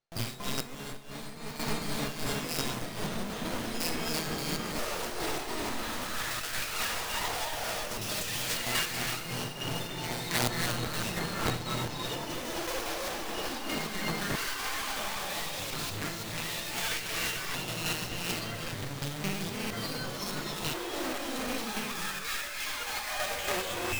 Sound effects > Electronic / Design
Sharp Sharpenings 2
abstract, ambient, noise, noise-ambient